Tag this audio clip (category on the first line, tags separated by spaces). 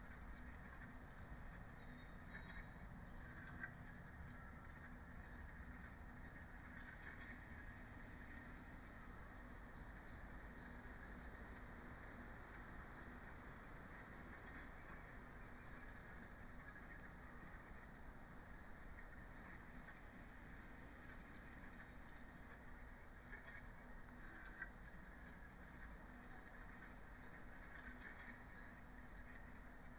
Soundscapes > Nature
phenological-recording artistic-intervention field-recording nature weather-data Dendrophone alice-holt-forest soundscape sound-installation raspberry-pi modified-soundscape data-to-sound natural-soundscape